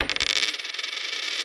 Sound effects > Objects / House appliances
OBJCoin-Samsung Galaxy Smartphone, CU Quarter, Drop, Spin 10 Nicholas Judy TDC
A quarter dropping and spinning.
drop, quarter, spin